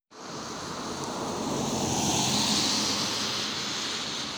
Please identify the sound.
Sound effects > Vehicles
tampere car28
vehicle, automobile, car